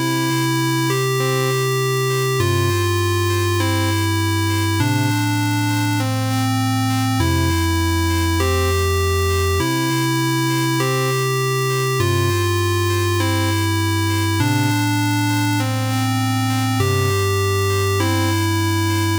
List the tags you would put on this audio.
Music > Multiple instruments
3xOsc 8bit adventure background-music calm chiptune exploration FL-Studio loop pixel-art-music retro sailing treasure-island video-game

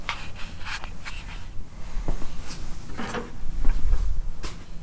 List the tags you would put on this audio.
Sound effects > Other mechanisms, engines, machines
boom knock sfx perc little pop shop bop tink fx wood strike rustle sound bam metal percussion crackle thud bang oneshot foley tools

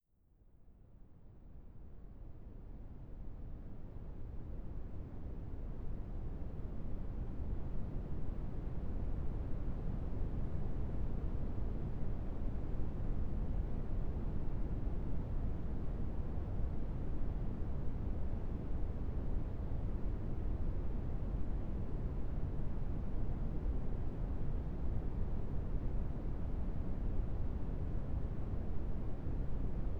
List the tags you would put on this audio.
Sound effects > Electronic / Design
brown-noise noise relaxation relaxing smooth tinnitus

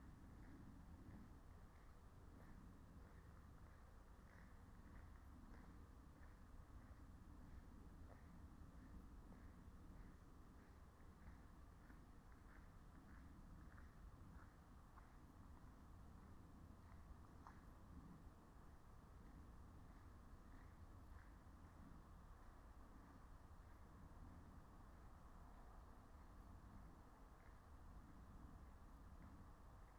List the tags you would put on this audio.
Soundscapes > Nature
soundscape field-recording nature phenological-recording raspberry-pi alice-holt-forest meadow natural-soundscape

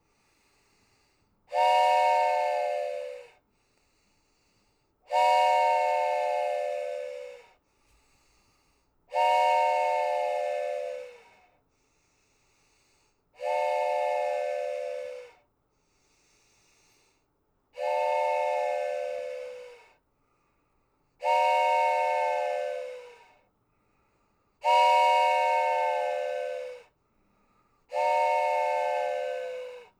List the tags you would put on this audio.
Sound effects > Vehicles
Blue-brand Blue-Snowball cartoon snoring train whistle

